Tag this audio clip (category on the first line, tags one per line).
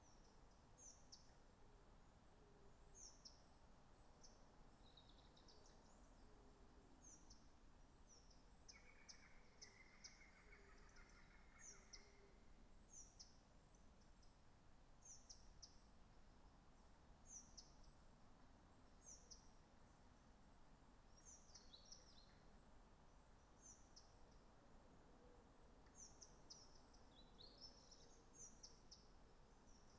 Soundscapes > Nature
data-to-sound
soundscape
modified-soundscape
phenological-recording
artistic-intervention
natural-soundscape
field-recording
raspberry-pi
sound-installation
Dendrophone
alice-holt-forest
nature
weather-data